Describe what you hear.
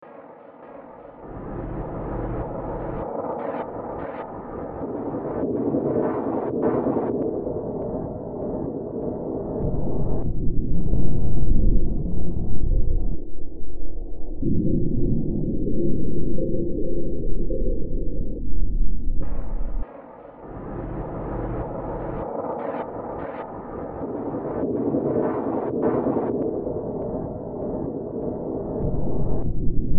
Soundscapes > Synthetic / Artificial
Looppelganger #158 | Dark Ambient Sound
Use this as background to some creepy or horror content.